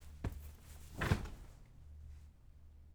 Sound effects > Objects / House appliances
Man falls on old sofa. Recorded with M-Audio M-TRACK II and pair of Soyuz 013 FET mics.
sofa, movement, furniture, human, falling, home